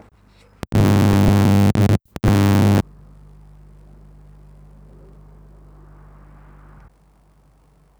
Sound effects > Electronic / Design
Powerline Glitch #1
A random Glitch I had while recording a humming Powerline with my Tascam DR40X.
Electric, FX, Glitch, Noise, Powerline, SFX, Static